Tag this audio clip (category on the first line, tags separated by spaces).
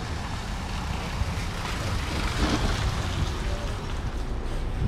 Sound effects > Vehicles
bus tires